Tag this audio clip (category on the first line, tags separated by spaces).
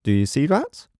Speech > Solo speech
Adult MKE600 FR-AV2 MKE-600 Calm Male Shotgun-microphone Voice-acting 2025 Shotgun-mic Tascam Sennheiser july do-you-see-that Single-mic-mono Hypercardioid VA noticing Generic-lines mid-20s